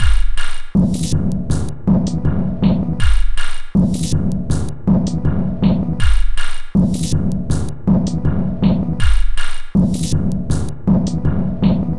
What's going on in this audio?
Instrument samples > Percussion
This 160bpm Drum Loop is good for composing Industrial/Electronic/Ambient songs or using as soundtrack to a sci-fi/suspense/horror indie game or short film.
Samples, Loopable, Dark, Weird, Industrial, Packs, Drum, Underground, Soundtrack, Alien, Loop, Ambient